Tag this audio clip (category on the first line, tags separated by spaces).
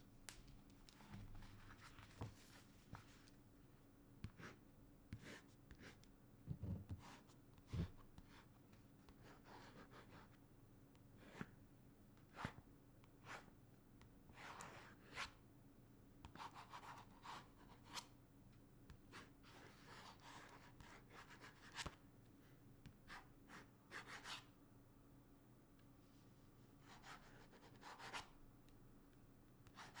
Sound effects > Objects / House appliances
writing notebook pen